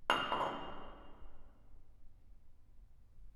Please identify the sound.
Sound effects > Objects / House appliances
Glass bottle set down on marble floor 1
A glass bottle being set down on a marble floor (in an apartment building stairwell). Recorded with a Zoom H1.
Bottle, Floor, Glass, Impact, Marble, Wine